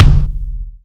Instrument samples > Percussion
(Very frontal/unbass, very beater/striker/mallet/attack-based kick. I don't like it.)